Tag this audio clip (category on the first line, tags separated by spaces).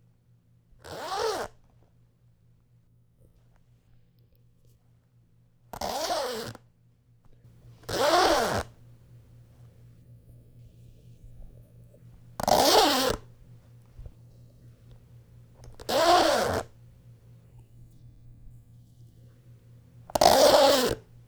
Sound effects > Objects / House appliances
coat jacket pants tent unzip zip zipper